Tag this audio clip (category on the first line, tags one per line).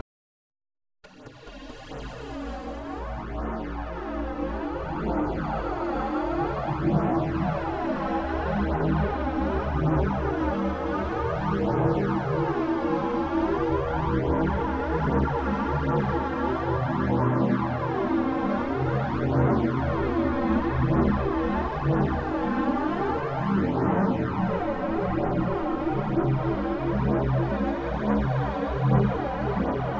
Soundscapes > Synthetic / Artificial
roar
glitch
effect
slow
long
howl
shimmering
experimental
ambience
fx
sfx
low
atmosphere
dark
synthetic
wind
rumble
bassy
drone
landscape
shimmer
evolving
ambient
shifting
alien
bass
glitchy
texture